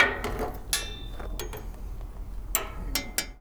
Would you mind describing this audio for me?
Sound effects > Objects / House appliances
Junkyard Foley and FX Percs (Metal, Clanks, Scrapes, Bangs, Scrap, and Machines) 76
dumpster, Machine, trash, Percussion, Ambience, Bash, Smash, tube, Junkyard, Robotic, Junk, Bang, Atmosphere, Clang, Metal, dumping, Foley, Environment, garbage, FX, Robot, rubbish, scrape, Metallic, Clank, rattle, waste, Perc, Dump, SFX